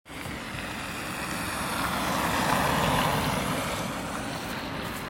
Sound effects > Vehicles
A car passing by from distance near insinnöörinkatu 60 road, Hervanta aera. Recorded in November's afternoon with iphone 15 pro max. Road is wet.